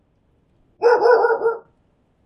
Sound effects > Animals
Dog barking. Recorded on 01/14/22 with a Sound Devices Mix Pre-3 and an Audio-Technica BP4025 microphone. Background noise filtered using Audacity. Rest in peace, Maggie. 11/28/25.